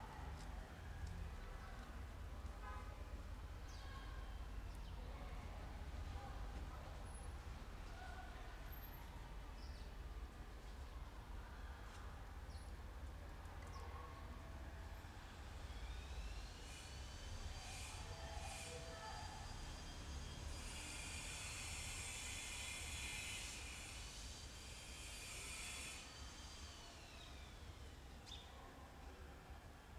Soundscapes > Urban
Tirana Residential amb1
A quiet morning in a residential street in Tirana, Albania. Schoolyard in the background, a neighbour using a grinder nearby, some cars. 2 x Micbooster EM272 mics in AB stereo (+/- 80cm)
albania, ambiance, ambience, city, field-recording, grinder, schoolyard, Shqiptar, tirana, urban